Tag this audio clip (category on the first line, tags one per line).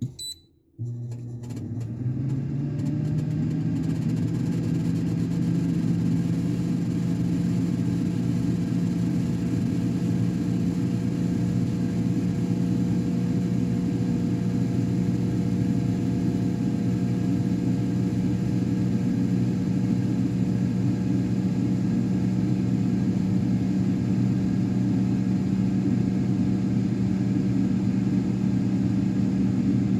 Sound effects > Objects / House appliances
air-fryer
beep
Phone-recording
press
run
start
stop